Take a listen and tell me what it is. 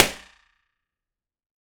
Soundscapes > Other
I&R - Bedroom corner (balloon) 4m90 X 3m X 2m70
Subject : Recording a Impulse and response of my home using a omni mic and poping a balloon. Here poping and recording from the corner of my room, where I feel like the reverb is the most present. Room dimensions : 4m90 X 3m X 2m70 Date YMD : 2025 July 07 Location : Albi 81000 Tarn Occitanie France. Superlux ECM-999 Weather : Processing : Trimmed, very short fade-in and a fade-out in Audacity, normalised.
impulse, Impulse-and-response, corner, resonating, home, convolution, reverb, echo, convolution-reverb, ECM-999, corner-pos, IR, response, FR-AV2, Superlux, Tascam, ECM999